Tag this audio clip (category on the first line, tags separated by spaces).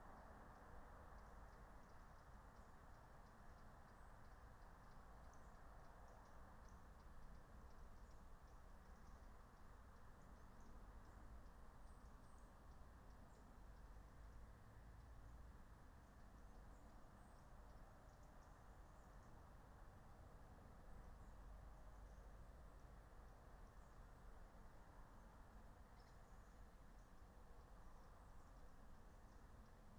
Soundscapes > Nature
soundscape natural-soundscape phenological-recording field-recording nature meadow alice-holt-forest raspberry-pi